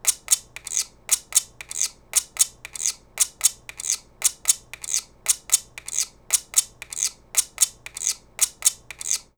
Music > Solo percussion
A latin guiro rhythm.